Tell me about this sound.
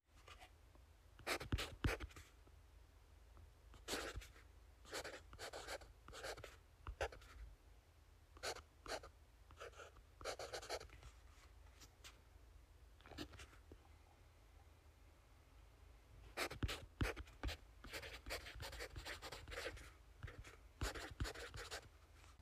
Sound effects > Objects / House appliances
Pencil scribbling/writing words